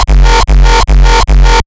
Music > Other
Zaag Kick Loop 2 by Batuhan Cansız
You can contact us for cooperation Fl Studio,Ableton,Logic Pro 150 BPM OTT,Camel Crusher,Kick Maker
hard, kick, zaag, loop, rawstyle, hardstyle